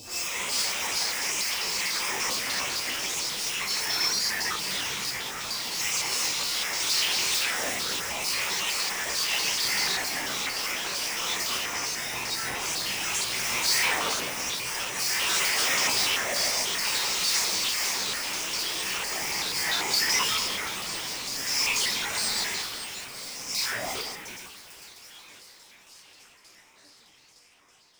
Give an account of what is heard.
Sound effects > Electronic / Design
Sharp Sharpenings 3
noise-ambient, abstract